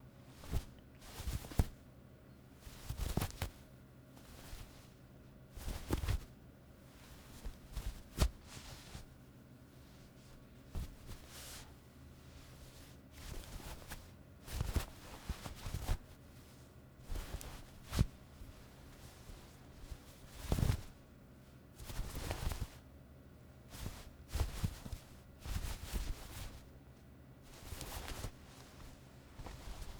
Sound effects > Other
Ruffling Clothing Foley
I recorded myself ruffling and generally interacting with a heavy hoodie - it is a long clip with many performances.